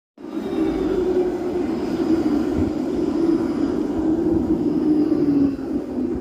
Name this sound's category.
Soundscapes > Urban